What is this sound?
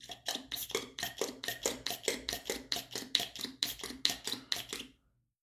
Sound effects > Objects / House appliances
FOLYProp-Blue Snowball Microphone, CU Shampoo, Soap Pump, Rapid Pumping Nicholas Judy TDC
A shampoo or soap pump pumping rapidly.
Blue-brand, rapid